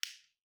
Sound effects > Objects / House appliances
The sound of a floor lamp switch can be used in animations, montages, and other projects. It's suitable for both turning the lamp on and off. Recorded on a Galaxy Grand Prime.
Light, objects, lamp, switch-on